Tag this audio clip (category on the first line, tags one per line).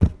Sound effects > Objects / House appliances
debris
clang
lid
container
drop
handle
spill
tool
carry
cleaning
slam
fill
plastic
shake
object
knock
hollow
clatter
liquid
kitchen
garden
metal
pour
scoop
household
tip
bucket
pail
foley
water